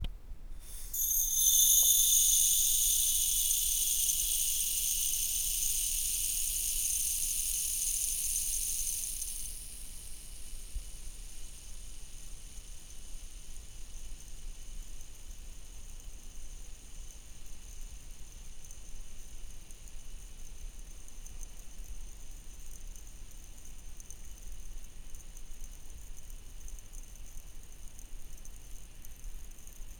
Sound effects > Objects / House appliances
product from Ikea